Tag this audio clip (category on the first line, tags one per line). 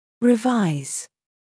Speech > Solo speech
voice
pronunciation
english